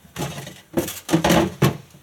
Sound effects > Objects / House appliances
A single drawer inside a freezer being pulled out and pushed back in. Recorded with my phone.